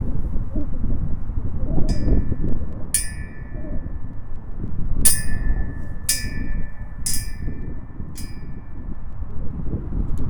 Soundscapes > Nature
tetherball chain blowing in the wind
DR05X, field, tascam